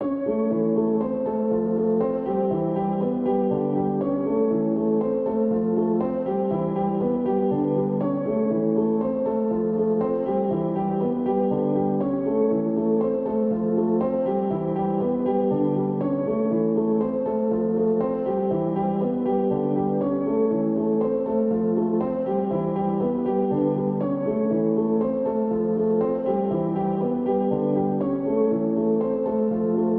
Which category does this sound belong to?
Music > Solo instrument